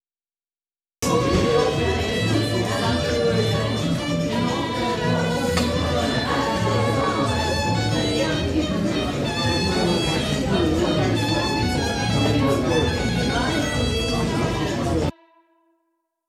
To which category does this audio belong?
Music > Multiple instruments